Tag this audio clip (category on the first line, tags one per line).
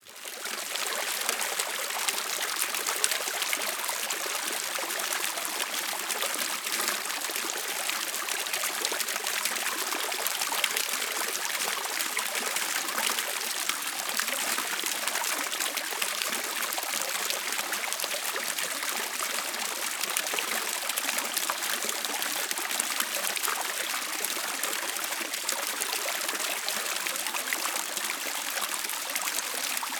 Soundscapes > Nature
river
waterstream
stream
creek